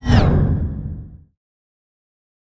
Sound effects > Other
Sound Design Elements Whoosh SFX 020
ambient, audio, dynamic, effect, element, elements, fx, movement, production, sound, sweeping, trailer, whoosh